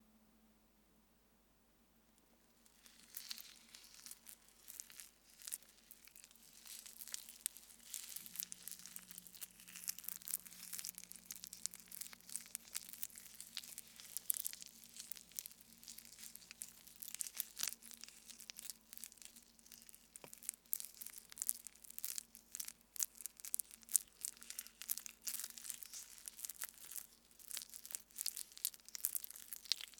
Sound effects > Natural elements and explosions
Sausage meat being squished by hand. Recorded in 2009, probably with a Sennheiser ME66 microphone.